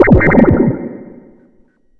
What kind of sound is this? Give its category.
Instrument samples > Synths / Electronic